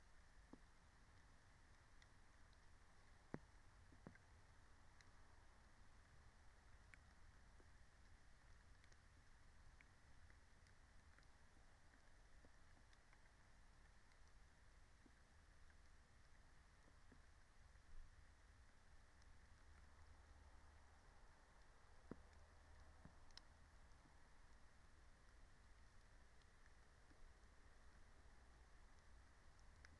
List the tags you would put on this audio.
Soundscapes > Nature
nature soundscape field-recording alice-holt-forest natural-soundscape raspberry-pi phenological-recording meadow

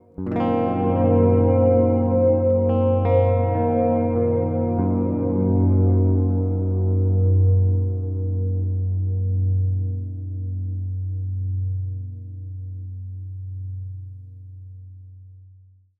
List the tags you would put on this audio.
Instrument samples > String
guitar baritone stereo electric chord reverb

